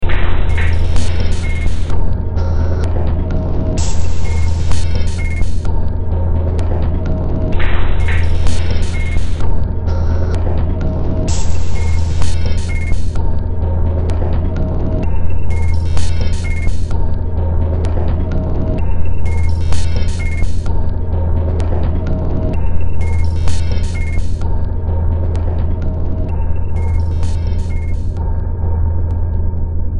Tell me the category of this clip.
Music > Multiple instruments